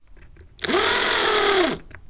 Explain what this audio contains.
Sound effects > Other mechanisms, engines, machines
Milwaukee impact driver foley-006
a collection of sounds recorded in my wood shop in Humboldt County, California using Tascam D-05 and processed with Reaper
Drill, Foley, fx, Household, Impact, Mechanical, Metallic, Motor, Scrape, sfx, Shop, Tool, Tools, Woodshop, Workshop